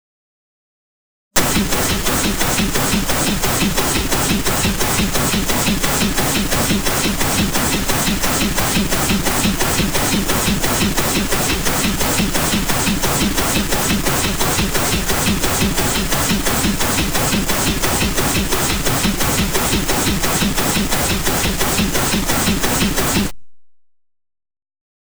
Music > Solo percussion
Simple Bass Drum and Snare Pattern with Weirdness Added 043
Experimental-Production, FX-Drum, FX-Drum-Pattern, FX-Drums, Silly